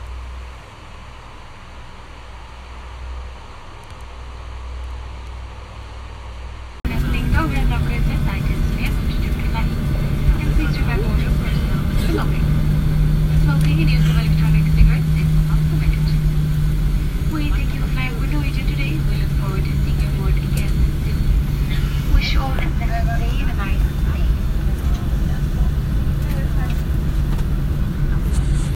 Soundscapes > Indoors
Inside Airplane, Bangkok, Thailand (Feb 20, 2019)
Recording from inside an airplane arriving in Bangkok. Includes engine hum, cabin noise, and muffled announcements.
airplane, ambient, Bangkok, cabin, engine, flight, interior, Thailand, transport, travel